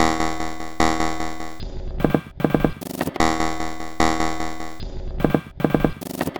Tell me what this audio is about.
Instrument samples > Percussion
This 150bpm Drum Loop is good for composing Industrial/Electronic/Ambient songs or using as soundtrack to a sci-fi/suspense/horror indie game or short film.
Alien, Packs, Underground, Industrial, Dark, Soundtrack, Loopable, Samples, Loop, Ambient, Weird, Drum